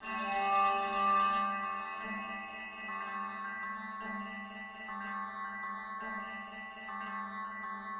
Sound effects > Electronic / Design
Tingle Bells
I finally sat down to explore Native Instruments Absynth sampler feature. I used samples from my, 'Broken Freezer Sample Pack' samples to make these noises. It is a low effort beginner pack. It is for documentation purposes but maybe you can find it useful.
christmas-sound-design, noise, sound-design, Christmas-themed, abstract, native-instruments-absynth, absynth